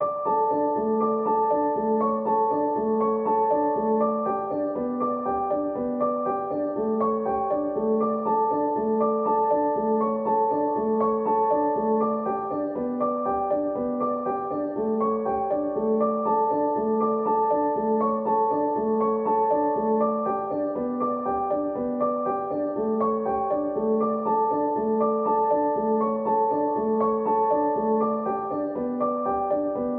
Music > Solo instrument
Piano loops 198 octave long loop 120 bpm
120bpm, music, samples, pianomusic, piano, simplesamples, simple, loop, free, reverb